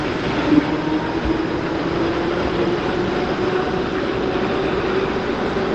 Soundscapes > Urban
A passing tram moving away while accelerating on a busy street. Recorded from an elevated position relative to the tram, using the default device microphone of a Samsung Galaxy S20+. TRAM: ForCity Smart Artic X34